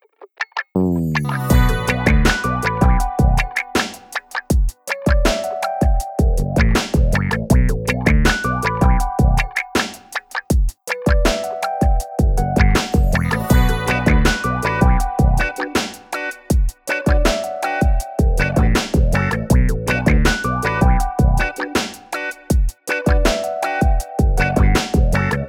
Music > Multiple instruments
Seductive Groove #1 (With Intro)
One of the players said they "engaged" the enemy without thinking about how that sounded. I couldn't resist writing this around that joke.
sexy-joke, seductive-music, porn-guitar, sex-comedy, comedic-sexy, sexy-funk, sensual, funny-sexy, seductive-theme, sexy-groove, sexy-bass, porno-groove, suggestive, sexy, seduction, seductive-groove, sexy-guitar